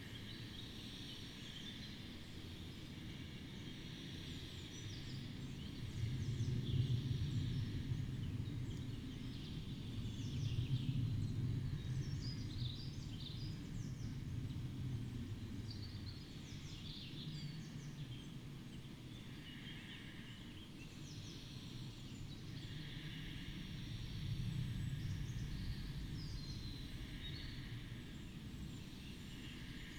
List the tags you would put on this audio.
Soundscapes > Nature
alice-holt-forest data-to-sound field-recording modified-soundscape natural-soundscape nature sound-installation soundscape

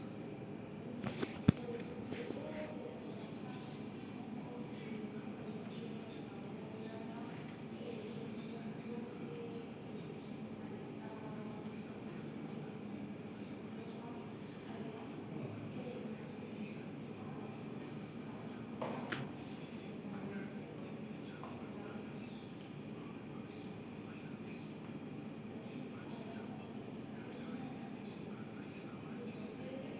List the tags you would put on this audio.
Soundscapes > Indoors
doctor emergencies hospital

